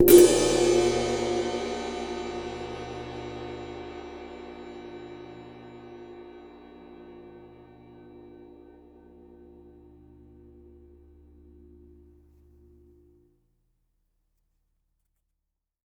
Music > Solo instrument
Paiste 22 Inch Custom Ride-004
22inch, Custom, Cymbal, Cymbals, Drum, Drums, Kit, Metal, Oneshot, Paiste, Perc, Percussion, Ride